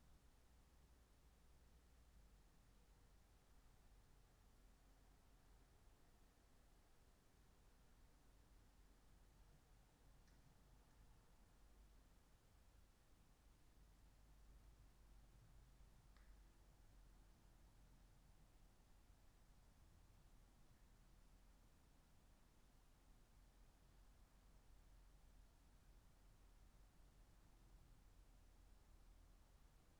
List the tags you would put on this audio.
Soundscapes > Nature
Dendrophone sound-installation alice-holt-forest natural-soundscape soundscape weather-data modified-soundscape raspberry-pi phenological-recording field-recording nature data-to-sound artistic-intervention

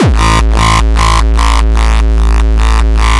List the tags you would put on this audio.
Instrument samples > Percussion
Dance Funny Happy Hardcore Hardstyle HDM Kick Party rawstyle Zaag Zaagkick

